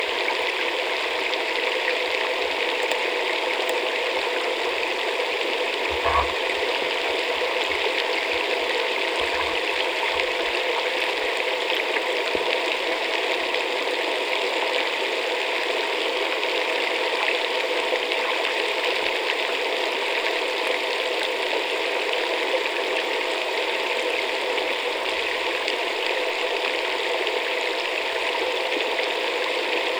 Soundscapes > Other
251006 Albi Fontaine de la passerelle - Hydrophone resting flat
Subject : Hydrophone soundscape of the fountain near the new passerelle (pedestrian bridge) Date YMD : 2025 October 06 Location : Albi 81000 Tarn Occitanie France. WIth a DIY piezoelectric hydrophone. Weather : Nice sunny day. Low to no wind. Processing : Trimmed and normalised in Audacity. Notes : Thanks to Felix Blume for his help and instructions to build the microphone, and Centre D'art le Lait for organising the workshop to build the DIY hydrophone. Note Rivers are low. Tarn probs has 1m less and Ruisseau Caussels some 30cm less.